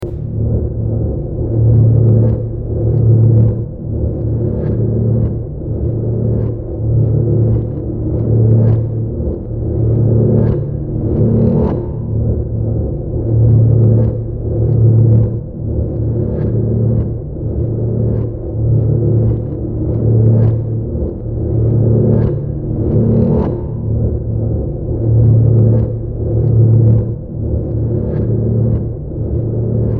Soundscapes > Synthetic / Artificial
Looppelganger #195 | Dark Ambient Sound
Use this as background to some creepy or horror content.